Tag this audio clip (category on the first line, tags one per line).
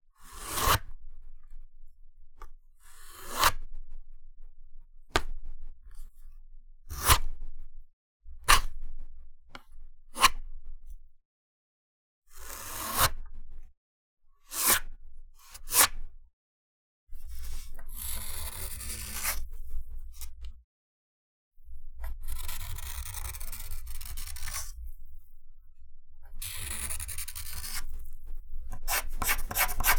Sound effects > Objects / House appliances
arcitecture
chalk
draft
drafting
draw
drawing
line
marker
pencil
scrape
scraping
write
writing